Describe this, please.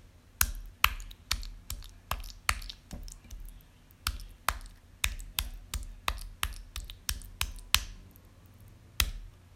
Sound effects > Natural elements and explosions
Wet Slaps/Footsteps
A wet slapping sound. Made by smacking a bowl of water, but reminds me of hurried footsteps.